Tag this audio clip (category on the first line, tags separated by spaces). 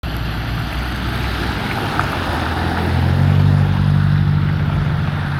Soundscapes > Urban
car engine